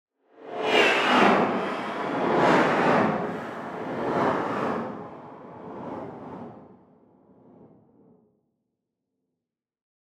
Sound effects > Other
CREAEthr ghost swirling over head
created this using noise from serum 2 and utilizing a doppler effect m4l device + a couple other snapheap presets to sweeten it up. was imaging a pair of ghosts or dementor-type creatures swirling overhead.
ucs, dementor